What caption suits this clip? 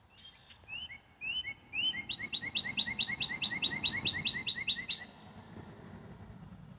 Sound effects > Animals
A male northern cardinal making its song.